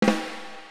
Music > Solo percussion
Snare Processed - Oneshot 160 - 14 by 6.5 inch Brass Ludwig
drums, rim, crack, hit, snares, sfx, snare, hits, fx, roll, realdrums, rimshots, flam, perc, drumkit, beat, acoustic, processed, brass, kit, snareroll, realdrum, percussion, drum, reverb, ludwig, rimshot, oneshot, snaredrum